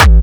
Instrument samples > Percussion
OldFiles-Classic Crispy Kick 1-A-Processed
brazilianfunk, Crispy, Distorted, Kick, powerful, powerkick